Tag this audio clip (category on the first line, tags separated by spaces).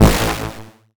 Instrument samples > Synths / Electronic
additive-synthesis fm-synthesis bass